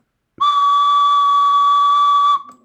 Instrument samples > Other
Flute sample. (MacBookAirM1 microphone in Reaper’s DAW)